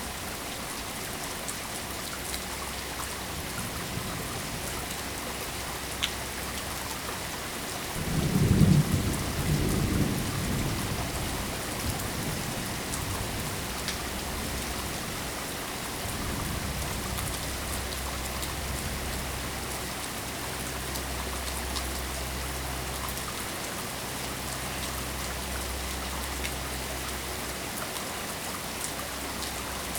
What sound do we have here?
Sound effects > Natural elements and explosions
Sounds of rain running off a roof with distant thunder.
Thunder, Rain, Runoff